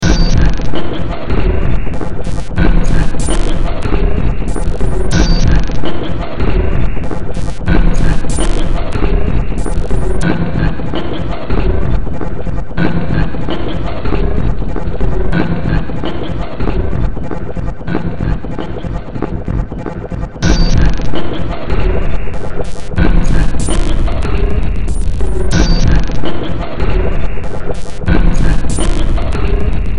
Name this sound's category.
Music > Multiple instruments